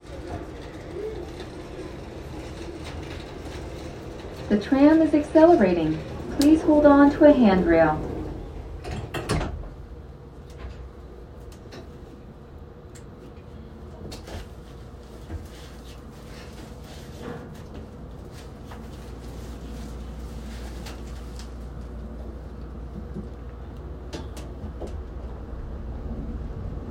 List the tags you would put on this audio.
Sound effects > Vehicles
railroad transport tram

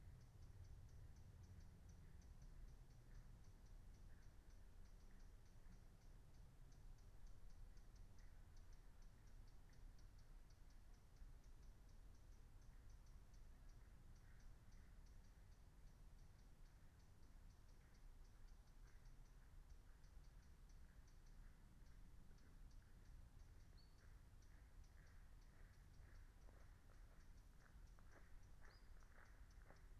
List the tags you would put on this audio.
Soundscapes > Nature
meadow natural-soundscape raspberry-pi soundscape field-recording alice-holt-forest phenological-recording nature

